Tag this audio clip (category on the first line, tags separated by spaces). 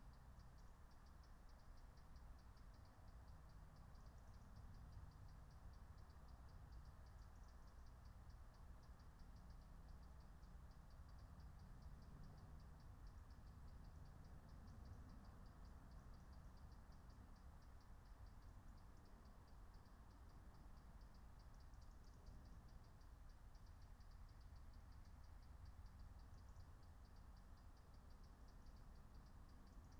Soundscapes > Nature
meadow natural-soundscape nature raspberry-pi soundscape